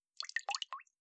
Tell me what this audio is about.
Sound effects > Natural elements and explosions
Multi Drip Free
Several brief dripping noises of water dripping into more water.
dripping, dripping-water